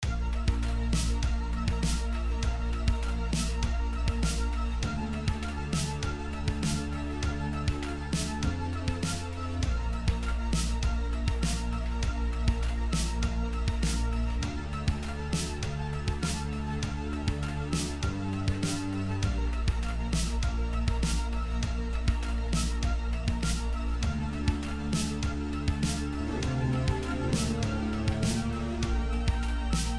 Music > Multiple instruments
1980s Song Fragment - 01
A 1980s style music fragment, number one. Made with a Sonicware LIVEN Texture Lab, drum machine, and a few reverbs. Made in FL Studio, 102 bpm.
synthesizer, 80s, 1980s, instrumental, eighties, loop, song, retro, music, synth